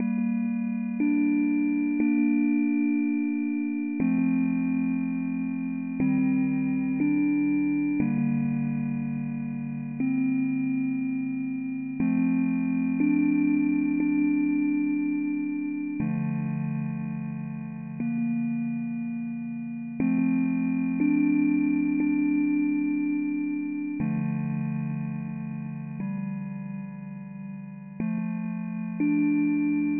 Music > Solo instrument
Sad video game music atmosphere [LOOP]
This song is so sad it makes me sad. Its cool maybe for videogames
musical, lost, defeat, loop, loopable, music